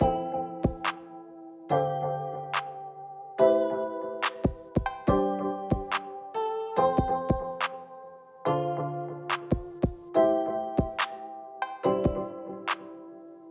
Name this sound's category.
Music > Multiple instruments